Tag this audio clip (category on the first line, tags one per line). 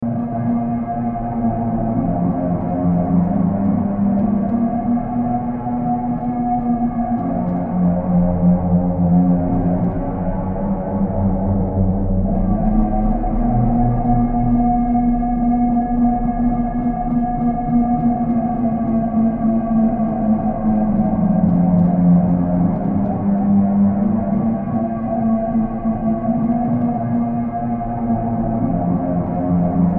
Soundscapes > Synthetic / Artificial
Drone
Noise